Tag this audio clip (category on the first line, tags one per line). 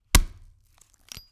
Sound effects > Experimental
bones
foley
onion
punch
thud
vegetable